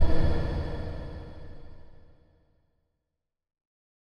Sound effects > Electronic / Design
IMMERSED UNDERGROUND HIT
INNOVATIVE, EXPLOSION, RUMBLING, HIT, DEEP, HIPHOP, RAP, BOOM, LOW, EXPERIMENTAL, UNIQUE, BASSY, DIFFERENT, RATTLING, IMPACT, TRAP